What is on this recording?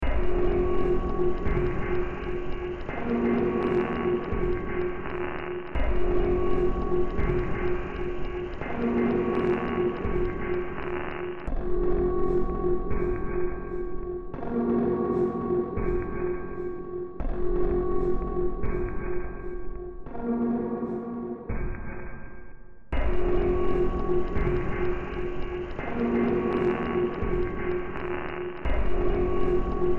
Music > Multiple instruments
Demo Track #3408 (Industraumatic)
Ambient, Soundtrack, Noise, Industrial, Sci-fi, Cyberpunk, Horror, Games, Underground